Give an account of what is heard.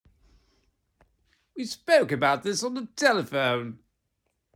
Speech > Solo speech
Me doing a silly Posh English voice saying “we spoke about this on the telephone”
english, vocal, about, voice, telephone, accent, spoke, Silly